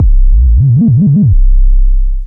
Instrument samples > Synths / Electronic
CVLT BASS 174
bass, bassdrop, clear, drops, lfo, low, lowend, stabs, sub, subbass, subs, subwoofer, synth, synthbass, wavetable, wobble